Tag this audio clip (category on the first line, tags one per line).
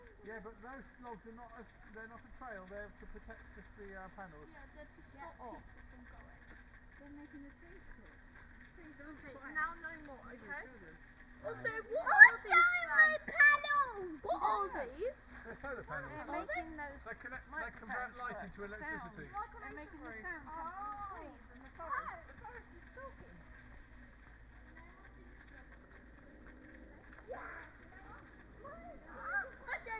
Soundscapes > Nature
weather-data
nature
raspberry-pi
field-recording
Dendrophone
natural-soundscape
artistic-intervention
sound-installation
alice-holt-forest
data-to-sound
modified-soundscape
phenological-recording
soundscape